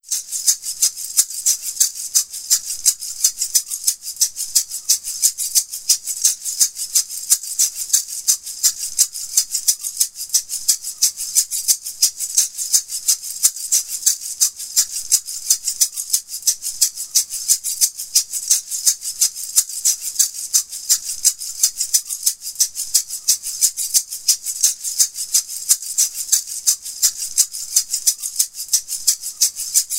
Music > Solo percussion
MUSCShake-Blue Snowball Microphone, CU Maracas, Latin Rhythm Nicholas Judy TDC
A latin maraca rhythm.